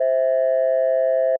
Instrument samples > Synths / Electronic
Landline Phonelike Synth C6
Holding-Tone, JI, JI-3rd, JI-Third, just-minor-3rd, just-minor-third, Landline, Landline-Holding-Tone, Landline-Phone, Landline-Phonelike-Synth, Landline-Telephone, Landline-Telephone-like-Sound, Old-School-Telephone, Synth, Tone-Plus-386c